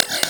Sound effects > Electronic / Design
RGS-Glitch One Shot 5
FX, Glitch, One-shot